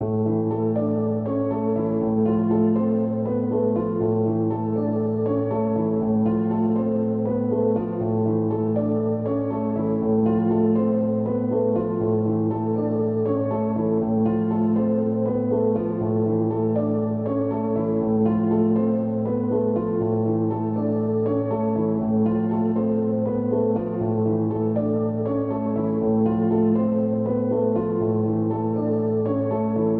Music > Solo instrument
Piano loops 039 efect 4 octave long loop 120 bpm
120, samples, piano, free, pianomusic, 120bpm, loop, simplesamples, music, simple, reverb